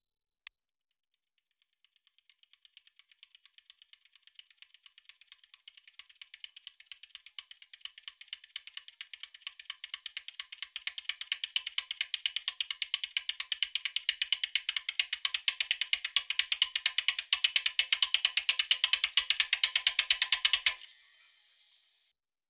Music > Solo percussion
guitar percussion riser
guitar, acoustic, techno